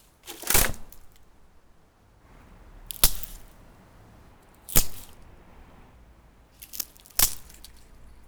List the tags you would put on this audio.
Sound effects > Natural elements and explosions
braking
forest
stick

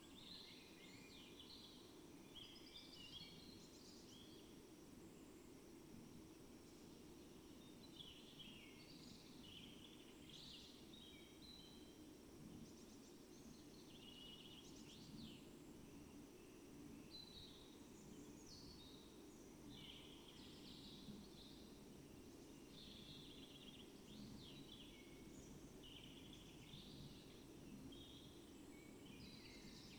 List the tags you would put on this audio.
Soundscapes > Nature
Dendrophone
natural-soundscape
phenological-recording
raspberry-pi
data-to-sound
artistic-intervention
weather-data
field-recording
alice-holt-forest
nature
modified-soundscape
sound-installation
soundscape

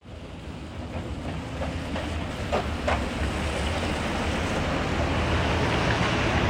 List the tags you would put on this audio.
Soundscapes > Urban
transport
vehicle
bus

